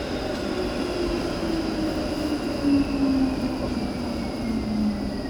Sound effects > Vehicles
A tram arriving by in Tampere, Finland. Recorded with OnePlus Nord 4.
tram, vehicle, transportation